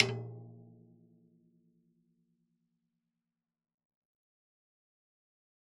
Music > Solo percussion
Med-low Tom - Oneshot 35 12 inch Sonor Force 3007 Maple Rack
recording, oneshot, acoustic, wood, toms, Tom, kit, med-tom, percussion, quality, real, beat, maple, Medium-Tom, drums, roll, realdrum, tomdrum, drum, perc, drumkit, loop, flam